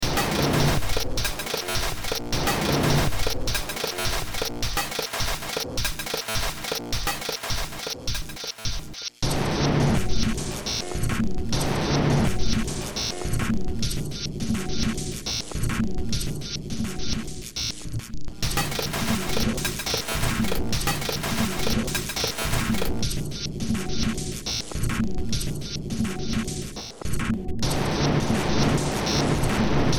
Music > Multiple instruments
Short Track #3425 (Industraumatic)
Industrial, Cyberpunk, Sci-fi, Noise, Ambient, Horror, Games, Soundtrack, Underground